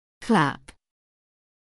Speech > Solo speech
to clap
english, word, voice, pronunciation